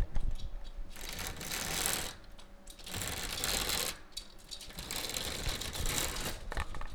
Sound effects > Objects / House appliances
Opening Window
Opening a window in house
Hand, Moving, Window